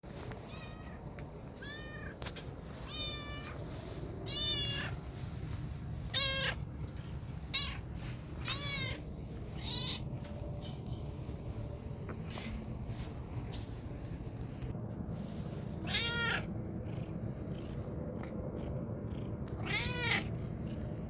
Sound effects > Animals
This is my cat meowing She is elderly and is greeting me as I arive home
meow, cat, purr, feline, greeting